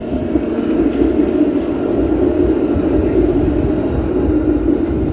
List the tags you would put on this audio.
Sound effects > Vehicles
Tampere
vehicle
tram

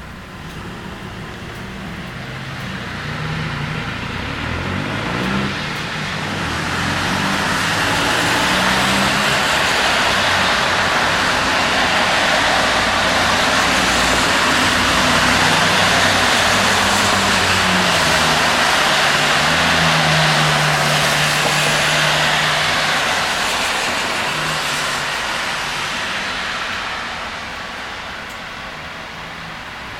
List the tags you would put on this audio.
Soundscapes > Other
road
cars
traffic

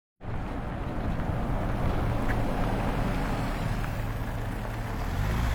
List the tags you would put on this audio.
Sound effects > Vehicles
bus
bus-stop
Passing

